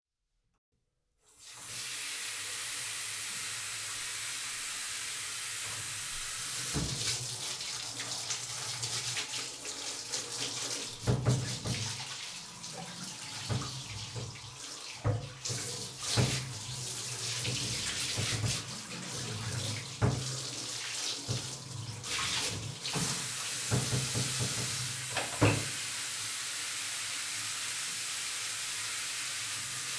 Soundscapes > Indoors
You can hear someone running a bath, that is, filling a bathtub with water. Microphones were placed in the hallway outside the bathroom to capture more ambient sound, and only one microphone was placed directly in the bathroom. This is the Single Track from the Mic that was directly in the Bathroom. However there are the other single tracks and a Mixed-Version although available.
drip, room, Bath, bathroom, liquid, water, roomtone